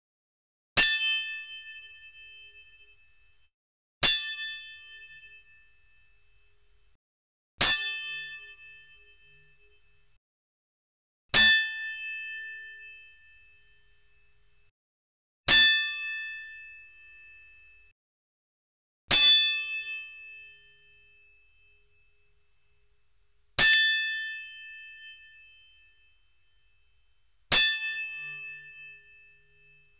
Sound effects > Objects / House appliances
custom sword fencing like rattly hits sounds inspired by heavens feel and demon slayer. utilizing metal silverware spoons and forks with two spoons tied or tapped to a string.